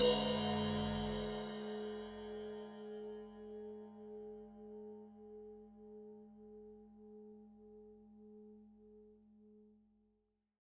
Music > Solo instrument
Cymbal hit with knife-005
Crash, Custom, Cymbal, Cymbals, Drum, Drums, FX, GONG, Hat, Kit, Metal, Oneshot, Paiste, Perc, Percussion, Ride, Sabian